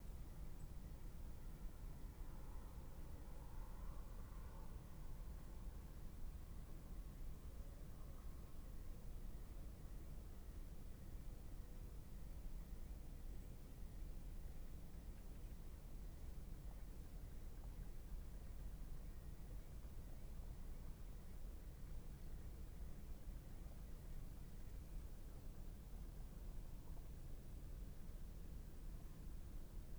Soundscapes > Urban
Quinzano Verona Distant amb2
The distant rumble of Verona, Italy, recorded from the heights of Quinzano hills in the afternoon of the january 1st. Low roar of the city, a few, very distant sounds from neighbours, construction site, dogs, some firecrackers. Wide AB omni stereo, recorded with 2 x EM272 Micbooster microphones & Tascam FR-AV2